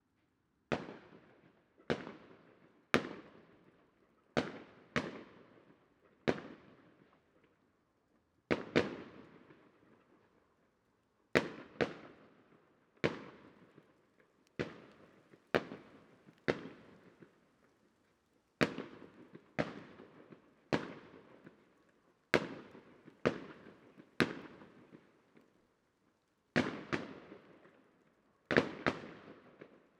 Sound effects > Other
Suburban Fireworks
Fireworks being set off in a suburban location. This is not part of an organised display. Recorded with Zoom F3 and Uši Pro mics.